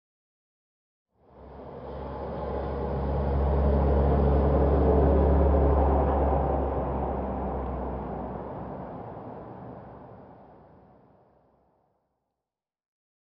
Soundscapes > Urban
car passing by1
car passing by sound.
automobile, car, drive, engine, motor, vehicle